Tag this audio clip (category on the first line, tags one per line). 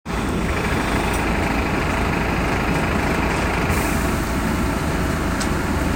Soundscapes > Urban
bus
traffic
bus-stop